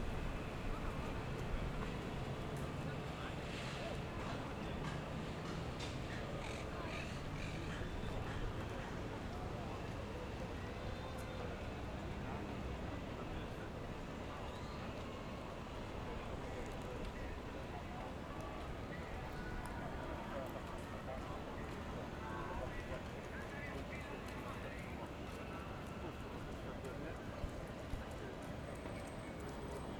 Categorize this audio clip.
Soundscapes > Urban